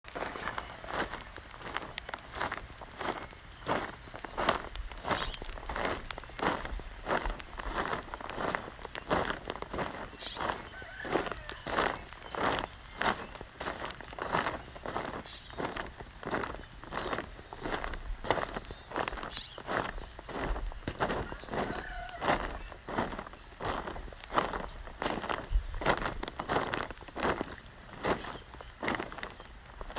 Soundscapes > Nature
Walking over black tarp while softly raining 10/23/2021
sound of walking over a large tarp while raining
bare-feet, farm, farmlife, field-recording, sof-rain, steps, walk, walking, walking-sound